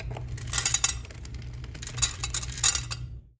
Sound effects > Objects / House appliances
MACHMisc-Samsung Galaxy Smartphone, CU Cat Food Dispenser, Dispensing Dry Cat Food Nicholas Judy TDC
A cat food dispenser dispensing dry cat food.